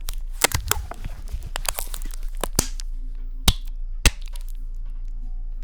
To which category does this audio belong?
Sound effects > Objects / House appliances